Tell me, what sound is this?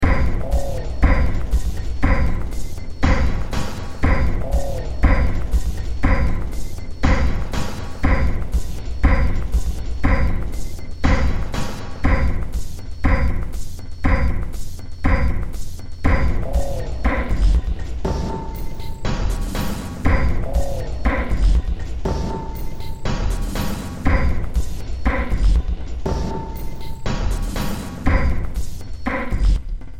Music > Multiple instruments
Demo Track #3487 (Industraumatic)
Ambient, Cyberpunk, Games, Horror, Industrial, Noise, Sci-fi, Soundtrack, Underground